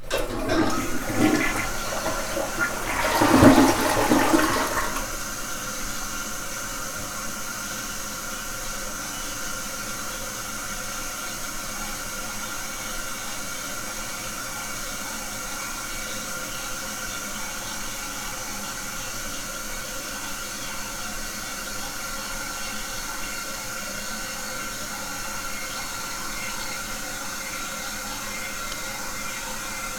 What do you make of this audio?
Sound effects > Objects / House appliances
A toilet flushing.